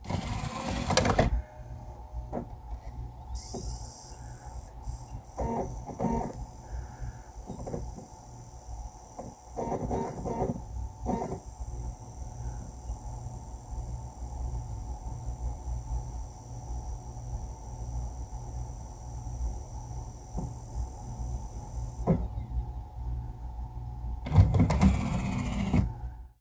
Sound effects > Objects / House appliances
COMAv-Samsung Galaxy Smartphone, CU DVD Player, Close, Start, Run, Stop, Open Nicholas Judy TDC
A DVD player closing, starting, running, stopping and opening.
close, dvd, dvd-player, open, Phone-recording, player, run, start, stop